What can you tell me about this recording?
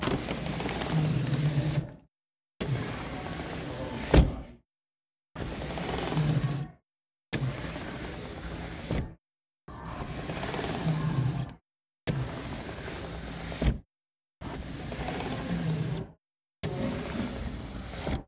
Sound effects > Objects / House appliances
WNDWPlas-Samsung Galaxy Smartphone, CU Double Hung Window, Slide Open, Close Nicholas Judy TDC
A double-hung window sliding open and closed. Recorded at Lowe's.
open, slide